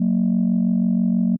Instrument samples > Synths / Electronic
Landline Phonelike Synth F#4
Synth, JI, JI-3rd, Landline, Landline-Phone, Landline-Phonelike-Synth, Landline-Holding-Tone, Tone-Plus-386c, just-minor-third, Holding-Tone, just-minor-3rd, Old-School-Telephone, Landline-Telephone-like-Sound, Landline-Telephone, JI-Third